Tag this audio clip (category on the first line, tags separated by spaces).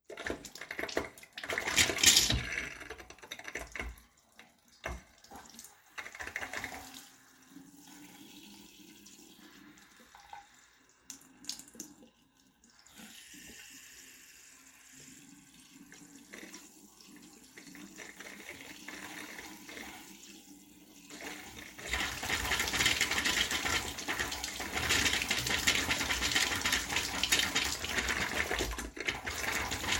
Sound effects > Objects / House appliances
malfunction sink Phone-recording cartoon